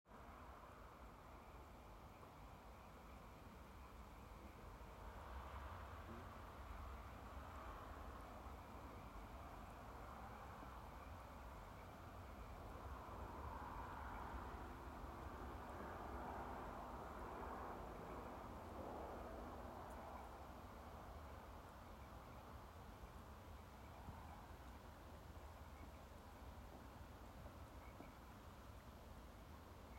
Soundscapes > Nature
Frogs, cows , road on January Sunday 01/16/2022
sound of frogs and cows
cows, field-recording, winter, frogs